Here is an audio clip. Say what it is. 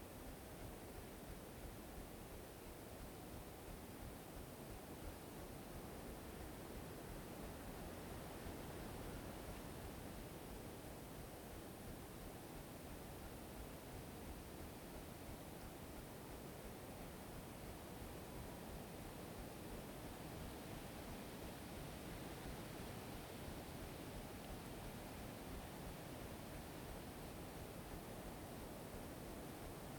Soundscapes > Nature
2025 09 11 06h00 Foret dominale de Detain-Gergueil - Omni mode h2n Q4
Subject : Ambience recording of the Foret dominale de Detain-Gergueil using a zoom H2n in 4channel surround mode. Here combing the sounds into 2 channels in post. Date YMD : 2025 September 11 at 06:00 Location : Gergueil 21410 Bourgogne-Franche-Comte Côte-d'Or France Hardware : Zoom H2n. With a freezer bag to protect it against rain and a sock over it for a wind-cover. Mounted up a tree on a Small rig magic arm. Weather : Processing : Trimmed and normalised in Audacity, mixed the front L/R and back L/R into this "surround" recording. Notes : Tips : Multiple other recordings throughout the night.